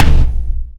Instrument samples > Percussion
basstom 1 less crumply
A main floortom 1. • I SEPARATELY inserted clones of good nearby/close upper and lower semiperiod groups. • I used the WaveLab 11 pen to smooth the bumpy/uneven/rough transitions. note/remark: In complicated waveforms we might not have pure first/upwave (wider peak region) and second/downwave (wider trough region) semiperiods, but we might have semiperiod groups.
Tama, tambour, ngoma, tam-tam, talktom, drum, Ludwig, djembe, talkdrum, DW, Yamaha, Sonor, floor, kettledrum, talking-drum, tenor-drum, percussion, tom, tom-tom, dundun, timpano, tabla, floortom, bongo, ashiko, bata, bougarabou, taboret, Pearl, Premier